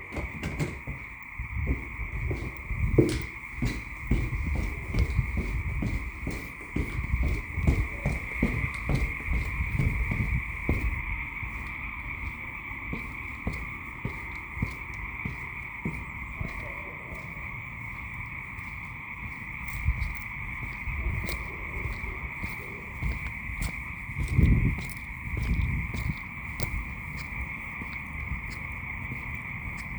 Soundscapes > Nature
Nature recording in the redwoods using Tascam dr-05 field recorder